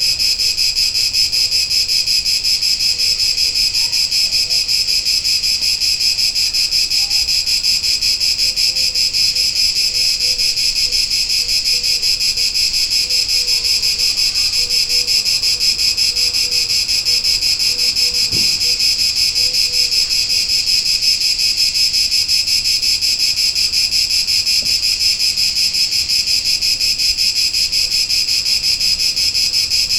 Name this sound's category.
Sound effects > Natural elements and explosions